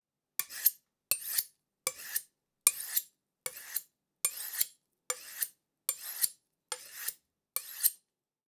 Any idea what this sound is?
Sound effects > Objects / House appliances
Sharpening a knife with a musat. Recorded in a real kitchen on Tascam Portacapture X8. Please write in the comments where you plan to use this sound. I think this sample deserves five stars in the rating ;-)